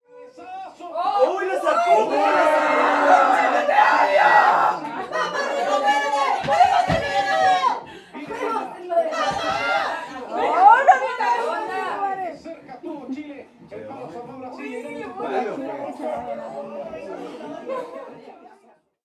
Soundscapes > Urban
Casi gol Valparaiso
Vocal sound of a crown screaming over a non scored goal while watching footbal in a household.